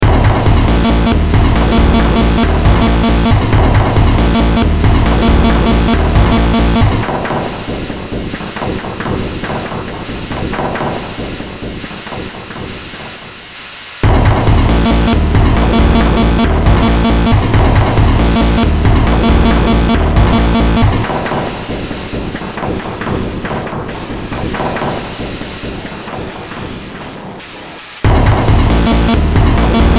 Music > Multiple instruments
Short Track #3915 (Industraumatic)
Ambient, Cyberpunk, Games, Horror, Industrial, Noise, Sci-fi, Soundtrack, Underground